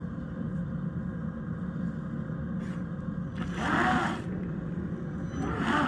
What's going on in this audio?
Sound effects > Vehicles
final bus 27
bus, hervanta, finland